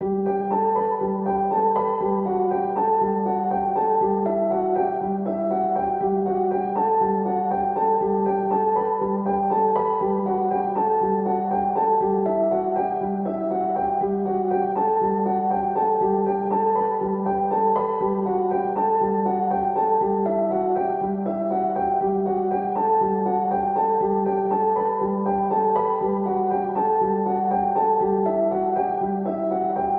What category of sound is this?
Music > Solo instrument